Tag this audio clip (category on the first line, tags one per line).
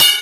Instrument samples > Percussion
bellcup
click-crash
crashcup
cupride
cymbal
cymbell
Meinl
metal-cup
ping
ride
Zildjian